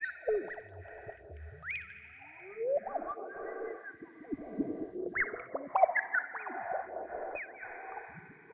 Soundscapes > Synthetic / Artificial
LFO Birsdsong 73
massive, LFO, Birsdsong